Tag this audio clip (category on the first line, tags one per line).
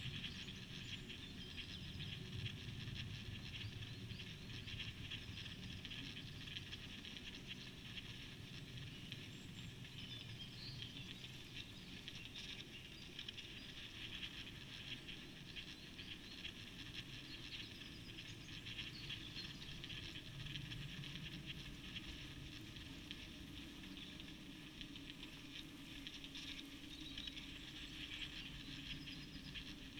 Soundscapes > Nature
nature
natural-soundscape
weather-data
Dendrophone
soundscape
sound-installation
phenological-recording
alice-holt-forest
artistic-intervention
raspberry-pi
modified-soundscape
field-recording
data-to-sound